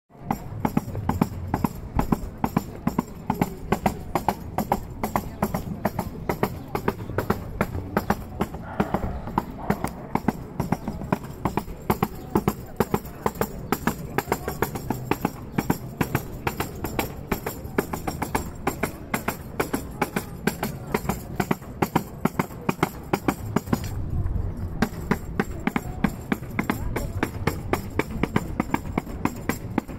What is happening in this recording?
Music > Solo percussion
Tambourine pllaying on Street Music Day (gatvės muzikos diena) , Vilnius 2025
Playing tambourine in Vilnius, Lithuania on Street Misic Day 2025